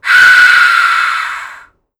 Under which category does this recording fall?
Sound effects > Animals